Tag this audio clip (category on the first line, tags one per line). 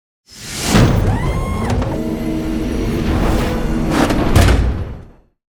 Sound effects > Other mechanisms, engines, machines
elements
motors
machine
hydraulics
design
gears
synthetic
operation
servos
sound
clicking
grinding
automation
mechanism
actuators
mechanical
digital
clanking
processing
whirring
feedback
movement
robot
metallic
circuitry
robotic
powerenergy